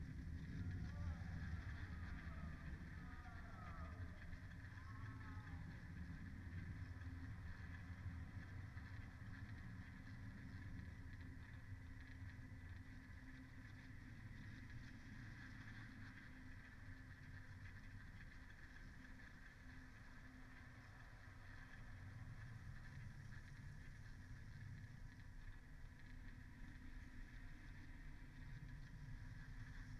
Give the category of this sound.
Soundscapes > Nature